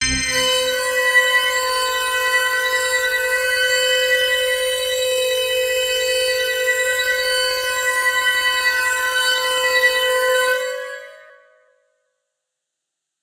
Instrument samples > Synths / Electronic
Synth ambient pad with a slow atmospheric pad sound. Note is C4